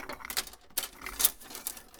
Sound effects > Other mechanisms, engines, machines
metal shop foley -110
bam, pop, foley, oneshot, metal, sound, bang, percussion, boom, knock, tink, shop, crackle, rustle, tools, fx, thud, perc, sfx, little, strike, bop, wood